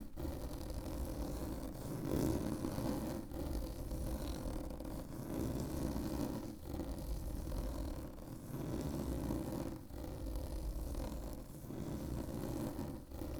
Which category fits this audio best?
Sound effects > Objects / House appliances